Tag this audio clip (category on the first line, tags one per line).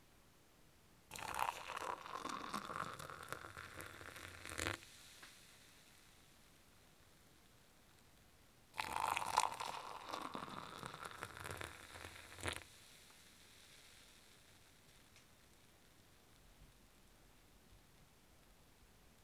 Sound effects > Human sounds and actions
beverage
drink
filling
glass
liquid
pouring
splash
water